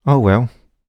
Speech > Solo speech
Sadness - Oh well 2
Single-take, singletake, Vocal, U67, FR-AV2, Tascam, NPC, Sadness, Neumann, dialogue, Video-game, Mid-20s, oneshot, Human, Man, Male, Voice-acting, sad, words, talk, voice